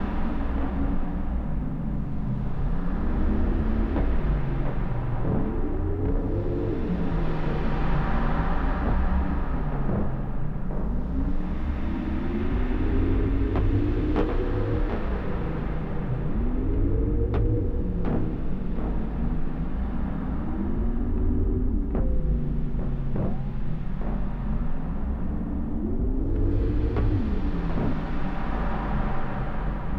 Soundscapes > Synthetic / Artificial
Old clifftop mechanics overlooking the wide ocean1
Old mechanics on clifftop overlooking windblown ocean. Hints of old tin mines, searching for minerals, argentiferous galena, garnet, olivine, quartz, serpentine. Brings back childhood memories. Enjoy the seascape...